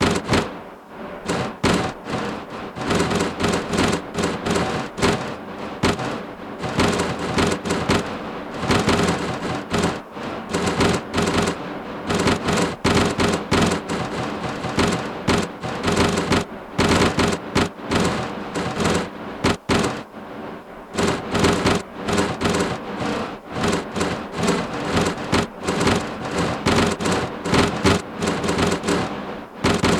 Sound effects > Other mechanisms, engines, machines
Tough Enigne4
Hi! That's not recorded sound :) I synth it with phasephant! Used a sound from Phaseplant Factory: MetalCaseShut1. I put it into Granular, and used distortion make it louder! Enjoy your sound designing day!
Machine, Broken, Engine